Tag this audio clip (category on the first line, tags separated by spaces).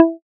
Instrument samples > Synths / Electronic
additive-synthesis fm-synthesis